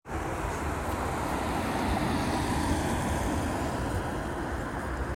Sound effects > Vehicles
car sunny 07
engine, vehicle